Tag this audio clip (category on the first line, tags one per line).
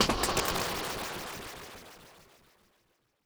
Sound effects > Experimental
abstract
alien
clap
crack
experimental
fx
glitch
glitchy
hiphop
idm
impacts
laser
lazer
otherworldy
perc
percussion
sfx
snap
whizz
zap